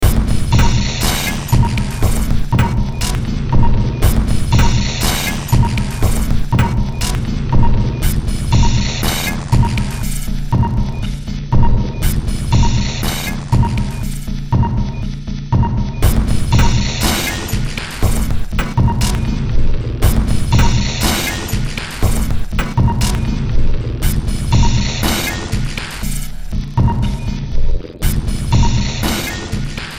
Music > Multiple instruments
Demo Track #4022 (Industraumatic)
Cyberpunk, Underground, Soundtrack, Ambient, Horror, Games, Industrial, Noise, Sci-fi